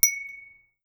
Sound effects > Objects / House appliances
BELLHand-Blue Snowball Microphone, CU Ding Nicholas Judy TDC
A bell ding.
bell, Blue-brand, Blue-Snowball, ding, hand